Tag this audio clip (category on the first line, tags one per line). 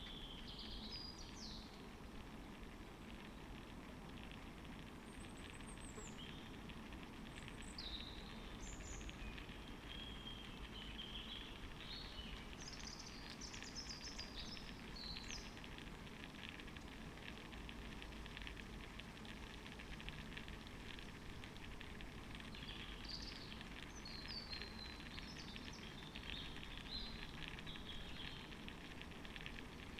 Soundscapes > Nature
alice-holt-forest
nature
phenological-recording
raspberry-pi
sound-installation
weather-data